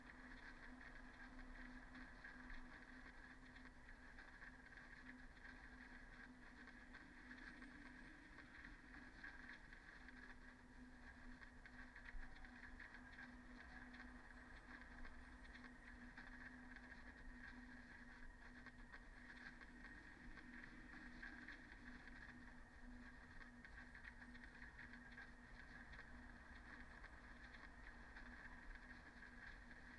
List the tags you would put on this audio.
Soundscapes > Nature
artistic-intervention
phenological-recording
Dendrophone
raspberry-pi
field-recording
data-to-sound
sound-installation
modified-soundscape
weather-data
alice-holt-forest
natural-soundscape
soundscape
nature

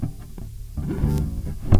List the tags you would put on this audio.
Instrument samples > String
bass
blues
charvel
electric
funk
fx
loop
loops
mellow
oneshots
pluck
plucked
riffs
rock
slide

